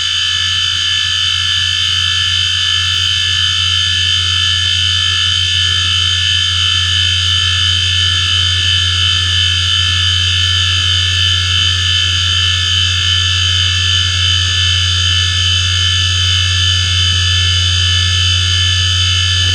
Sound effects > Objects / House appliances
hd-spining
A broken hard disk spinning.
noise, machine, electric-motor